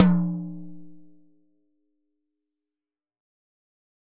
Music > Solo percussion
Hi Tom- Oneshots - 46- 10 inch by 8 inch Sonor Force 3007 Maple Rack
beat, beatloop, beats, drum, drumkit, fill, hi-tom, hitom, oneshot, perc, percs, percussion, rim, rimshot, studio, tom, tomdrum, velocity